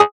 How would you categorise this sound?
Instrument samples > Synths / Electronic